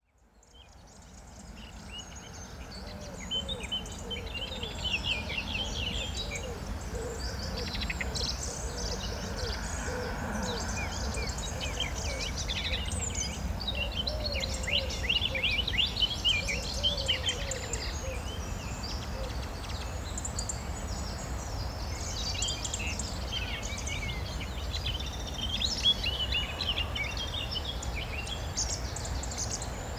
Soundscapes > Nature
A morning Recording at Gentleshaw Common, Staffordshire,.